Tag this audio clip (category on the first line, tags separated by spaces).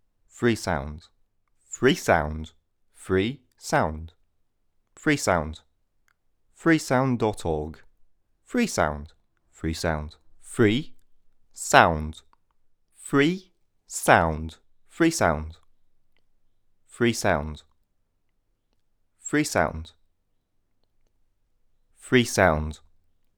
Speech > Solo speech
English France male multi-take freesound20 20s Rode